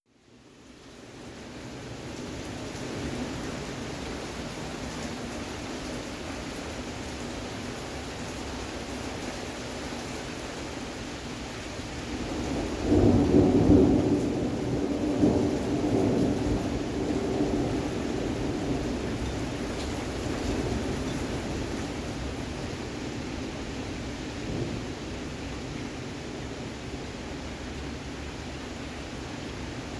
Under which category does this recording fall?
Soundscapes > Nature